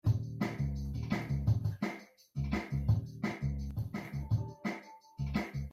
Music > Multiple instruments
music for menu and loading zones in games
small music section for loading menus and menus in video games
electro, electronic, loop, Video-game